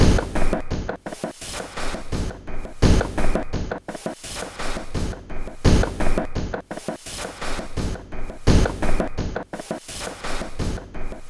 Instrument samples > Percussion
This 170bpm Drum Loop is good for composing Industrial/Electronic/Ambient songs or using as soundtrack to a sci-fi/suspense/horror indie game or short film.
Packs, Industrial, Ambient, Loop, Soundtrack, Weird, Alien, Dark, Samples, Loopable, Underground, Drum